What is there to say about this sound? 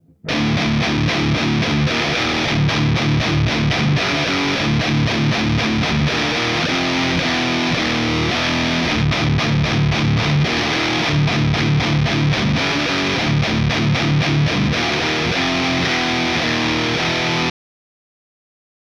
Music > Solo instrument
Heavy Metal style riffs made by me, using a custom made Les Paul style guitar with a Gibson 500T humbucker. 5150 TS profile used via a Kemper Profiler Amp, recorded on Reaper software.
heavy, Metal, riffs